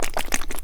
Sound effects > Other mechanisms, engines, machines
a collection of foley and perc oneshots and sfx recorded in my workshop